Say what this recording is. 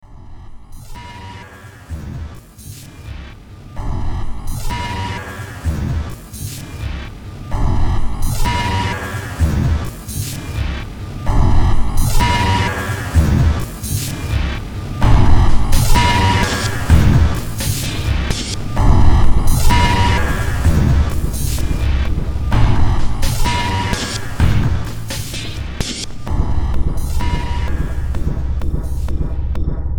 Music > Multiple instruments
Demo Track #3226 (Industraumatic)
Ambient, Cyberpunk, Games, Horror, Industrial, Noise, Sci-fi, Soundtrack, Underground